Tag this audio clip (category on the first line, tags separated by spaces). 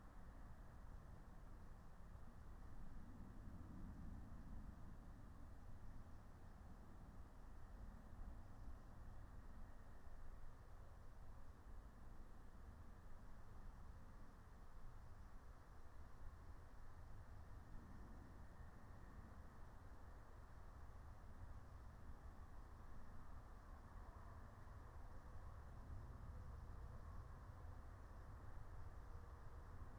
Soundscapes > Nature
soundscape alice-holt-forest nature field-recording phenological-recording